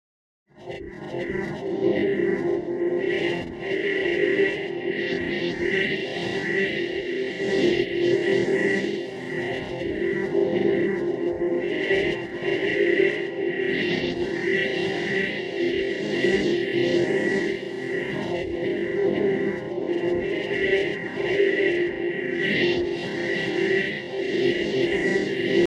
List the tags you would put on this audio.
Sound effects > Experimental
atmo
effect
ambient
pad
drone
background
atmosphere
sound-design
desing

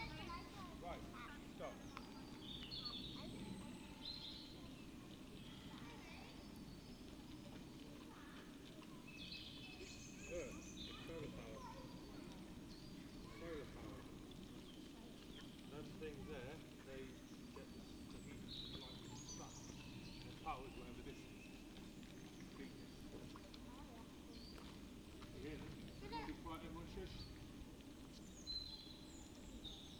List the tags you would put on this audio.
Soundscapes > Nature
data-to-sound
weather-data
raspberry-pi
Dendrophone
soundscape
natural-soundscape
phenological-recording
sound-installation
artistic-intervention
modified-soundscape
field-recording
nature
alice-holt-forest

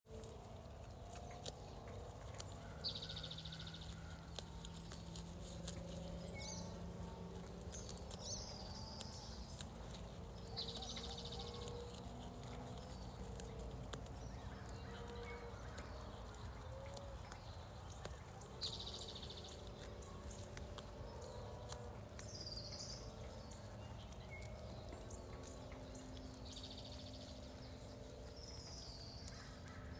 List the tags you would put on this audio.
Soundscapes > Nature
country
eating-sheep
farmland
field-recordings
morning-sound-farm
munching

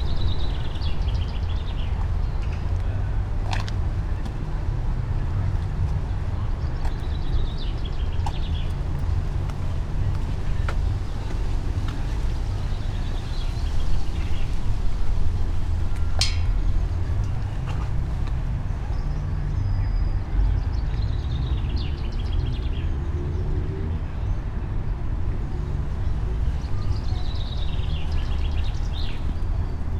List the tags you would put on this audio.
Soundscapes > Nature
ambiant
Battersea
field-recording
park
public

